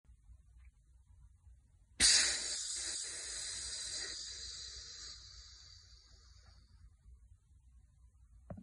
Sound effects > Other mechanisms, engines, machines
Pressure leak I made with my mouth. Made it for a college project.
boiling-water, steam, whistle